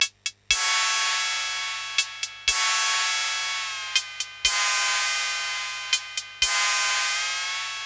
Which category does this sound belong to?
Music > Solo percussion